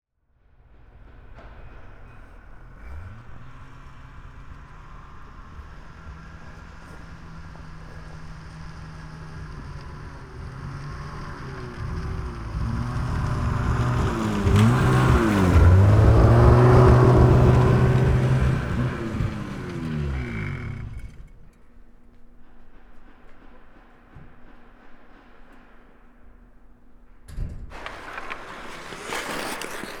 Soundscapes > Indoors
Hockey players at Pakuashipi arena
Recorded in Pakuahsipi, QC, Canada Winter 2020. With stereo recorder Sony PCM D50 <3.
ice, quebec, sport